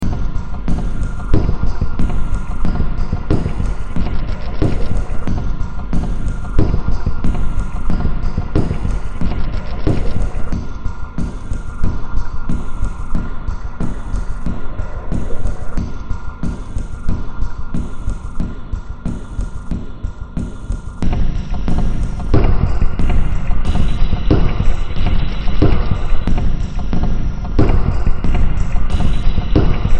Music > Multiple instruments
Demo Track #3104 (Industraumatic)
Ambient, Cyberpunk, Games, Horror, Industrial, Noise, Sci-fi, Soundtrack, Underground